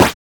Instrument samples > Percussion
8 bit-Noise Percussion7
8-bit, FX, game, percussion